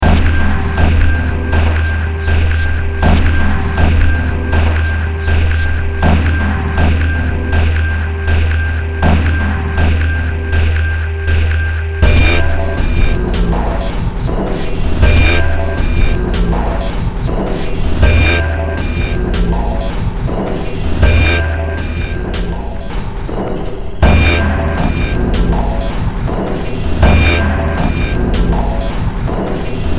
Music > Multiple instruments
Short Track #3271 (Industraumatic)
Ambient, Cyberpunk, Games, Horror, Industrial, Noise, Sci-fi, Soundtrack, Underground